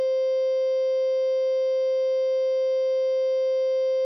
Instrument samples > Synths / Electronic
Recorder Synth C5 Recorded using a microphone off laptop Made using additive synthesis.
Recorder, electronic